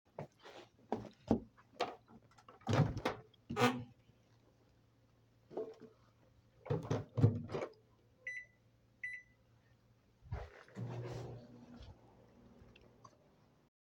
Sound effects > Objects / House appliances
Person using microwave and pushing the buttons on it